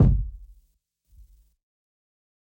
Instrument samples > Percussion
Kick - soft
perc, lofi, electronic, drum